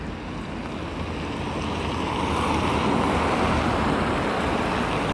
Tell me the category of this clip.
Soundscapes > Urban